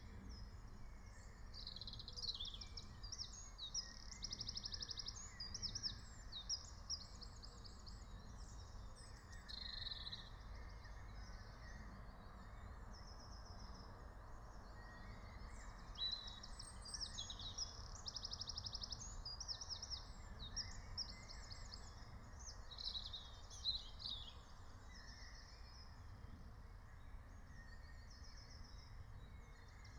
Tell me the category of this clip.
Soundscapes > Nature